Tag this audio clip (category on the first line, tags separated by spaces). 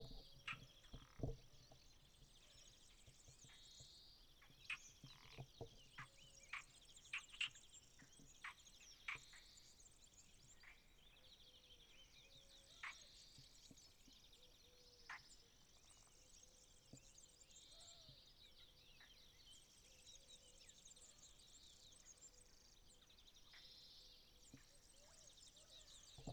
Sound effects > Animals
NATURE FROGS WILDLIFE LAKE BIRDSONG